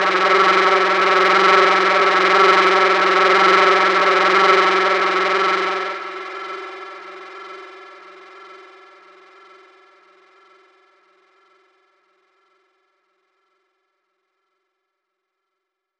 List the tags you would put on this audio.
Speech > Other
pain
snarl
yell

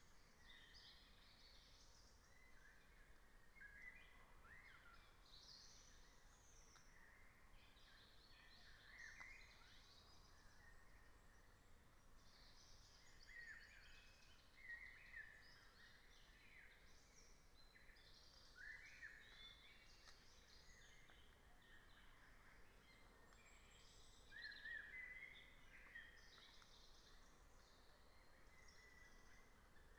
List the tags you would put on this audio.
Soundscapes > Nature
artistic-intervention
modified-soundscape
raspberry-pi
sound-installation
weather-data

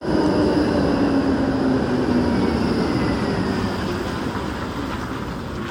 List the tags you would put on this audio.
Sound effects > Vehicles
city
Tram
urban

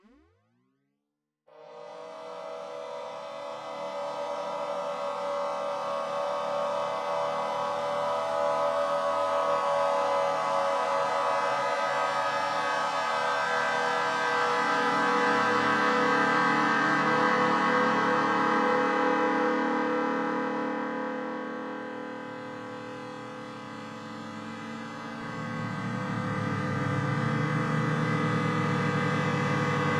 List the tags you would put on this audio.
Soundscapes > Synthetic / Artificial
alien
ambience
ambient
atmosphere
bass
bassy
dark
drone
effect
evolving
experimental
fx
glitch
glitchy
howl
landscape
long
low
roar
rumble
sfx
shifting
shimmer
shimmering
slow
synthetic
texture
wind

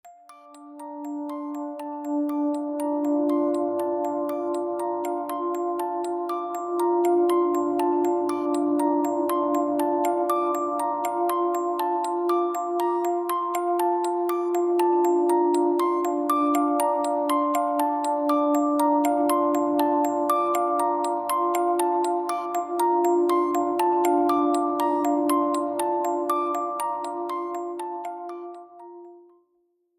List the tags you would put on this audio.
Music > Multiple instruments
atmospheric
box
cinematic
dark
dramatic
music
ominous
suspense
thriller

